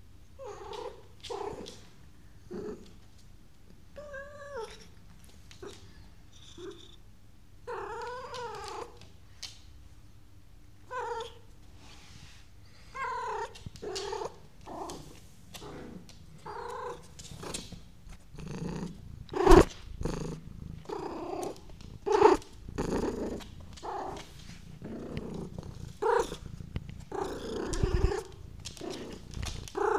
Sound effects > Animals
Cat purring and walking on parquet flooring. Gata ronroneando y caminado por encima de parquet.
purr, pet